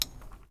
Sound effects > Objects / House appliances
Junkyard Foley and FX Percs (Metal, Clanks, Scrapes, Bangs, Scrap, and Machines) 4
Environment, waste, FX, Dump, trash, garbage, Foley, Perc, Clank, dumping, scrape, Clang, Atmosphere, tube, Metallic, Junkyard, rattle, Robotic, Bang, Ambience, dumpster, Smash, Percussion, Junk, SFX, Bash, Robot, Machine, Metal, rubbish